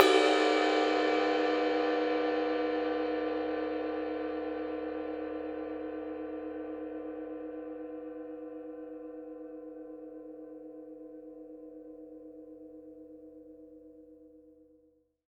Music > Solo instrument
Paiste 22 Inch Custom Ride-009
Kit, Cymbals, Paiste, 22inch, Cymbal, Ride, Metal, Percussion, Perc, Drum, Custom, Oneshot, Drums